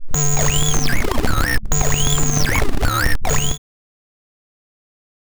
Sound effects > Electronic / Design
Optical Theremin 6 Osc Destroyed-025
Glitch, DIY, Robotic, Sci-fi, Alien, FX, Experimental, Synth, Electronic, SFX, Instrument, Spacey, Scifi, noisey, Theremins, Otherworldly, Trippy, Sweep, Digital, Glitchy, Noise, Robot, Handmadeelectronic, Dub, Analog, Infiltrator, Theremin, Electro, Optical, Bass